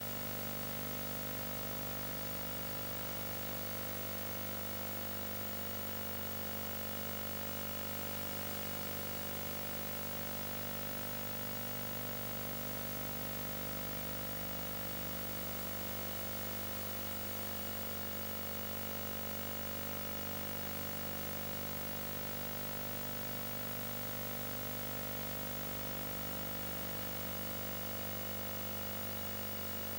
Sound effects > Other
Analog video hum
Recording of background noise signal that a Broksonic CIRT-1080 CRT received from UHF frequency from my transmitter, recorded via direct line out from the CRT into the Zoom H1n. My transmitter did not send any audio signal, so the following sound was mainly produced by external interference and the electronics in the transmitter and receiver
analog, broadcast, CRT, electric, hum, interference, noise, over-air, radio, static, TV